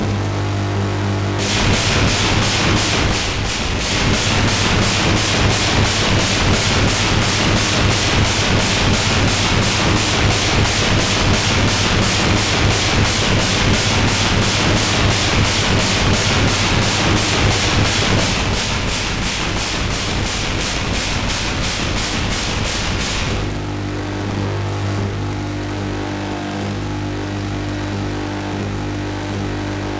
Music > Solo percussion
Simple Bass Drum and Snare Pattern with Weirdness Added 023
Four-Over-Four-Pattern, Experimental-Production, FX-Drum-Pattern, Silly, Experiments-on-Drum-Patterns, Experimental, Bass-and-Snare, Simple-Drum-Pattern, FX-Drums, Bass-Drum, Glitchy, FX-Laden-Simple-Drum-Pattern, FX-Drum, Experiments-on-Drum-Beats, Noisy, Fun, Snare-Drum, FX-Laden, Interesting-Results